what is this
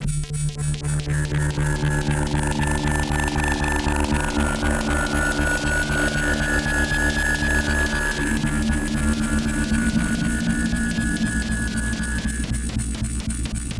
Sound effects > Experimental
a synthy glitchy harmonic rhythmic drone made from a ton of processing synth sounds in reaper
sound-design, glitch, freaky, fx, doom, scifi, electric, digital, experimental, sounddesign, noise, loop, lo-fi, abstract, weird, future, soundeffect, strange, sfx, looming, otherworldly, sci-fi, electronic, harmonic, effect, glitchy, drone, alien